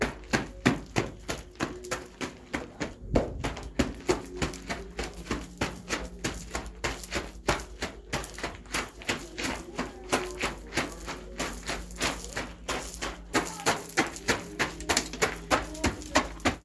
Sound effects > Human sounds and actions
FEETHmn-Samsung Galaxy Smartphone, MCU Running, Rocky Road Nicholas Judy TDC
Running on rocky road. Recorded at Hanover Pines Christmas Tree Farm.